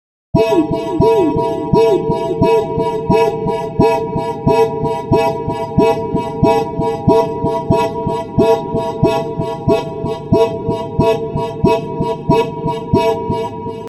Sound effects > Other
A sound i have generated myself with pc audio software, suitable for alarms and alerts.
alarm, alert, atomic, emergency, horn, siren, sound